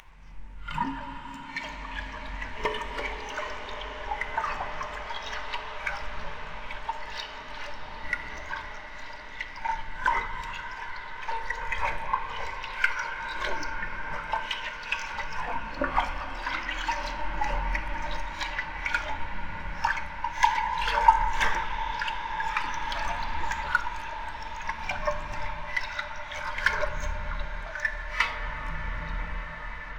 Sound effects > Experimental
Scary sloshing water ambience
A variety of water sounds processed with reverb and other effects, creating a sort of creepy, watery atmosphere.
sloshing, atmosphere, horror, splash, scary, water, waves, atmospheric, splashing